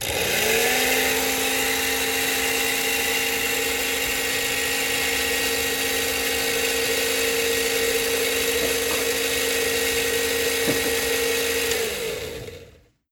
Sound effects > Objects / House appliances
MACHAppl-Samsung Galaxy Smartphone, CU Drink Master, Turn On, Run at Low Speed, Turn Off Nicholas Judy TDC
A Hamilton Breach drink master turning on, running at low speed and turning off.